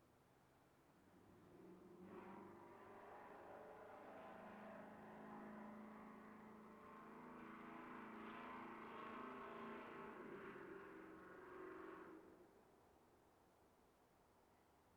Soundscapes > Urban
Loud truck muffler
One of Whitehorse, Yukon’s many, many "truckwits" accelerates in the medium distance on an otherwise quiet August evening. Recorded on a Zoom H2n in 150-degree stereo mode.
accelerate, riverdale, engine, yukon, muffler, whitehorse, field-recording, truck